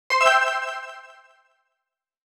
Sound effects > Electronic / Design
GAME UI SFX PRACTICE 1
Program : FL Studio, Purity